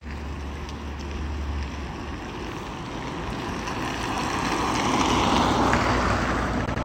Sound effects > Vehicles
Car driving by. Recorded with a phone on a cold, cloudy day.
road, car